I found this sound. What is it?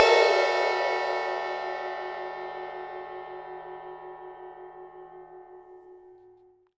Music > Solo instrument
Sabian 15 inch Custom Crash-17
15inch, Crash, Custom, Cymbal, Cymbals, Drum, Drums, Kit, Metal, Oneshot, Perc, Percussion, Sabian